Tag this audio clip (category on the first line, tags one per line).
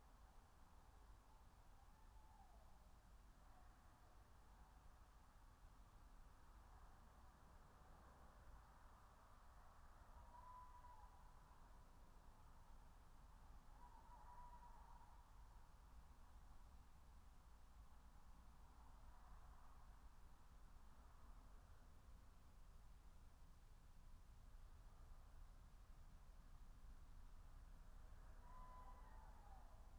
Soundscapes > Nature
nature
alice-holt-forest
meadow
soundscape
field-recording
raspberry-pi
natural-soundscape
phenological-recording